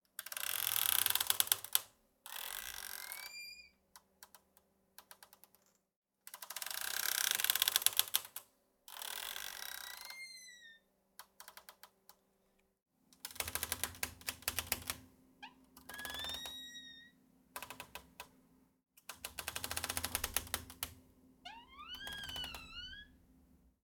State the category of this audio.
Sound effects > Objects / House appliances